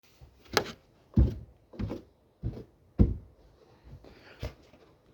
Sound effects > Natural elements and explosions
Koa walking on wood floor
environment, walking, wood